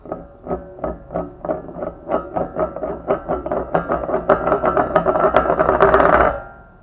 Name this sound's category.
Sound effects > Objects / House appliances